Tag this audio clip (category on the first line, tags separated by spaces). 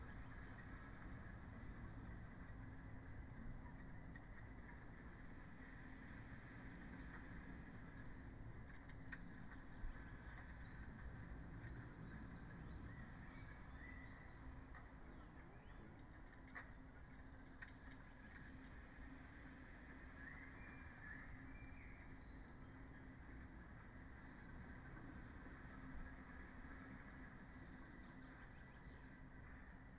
Soundscapes > Nature
alice-holt-forest artistic-intervention data-to-sound modified-soundscape nature raspberry-pi sound-installation weather-data